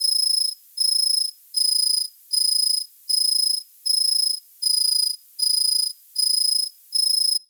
Soundscapes > Synthetic / Artificial
11 - Atmospheres & Ambiences - Crickets A
A synthesised cricket.
field, ambience, crickets, summer, electronic, insects, bugs, ambient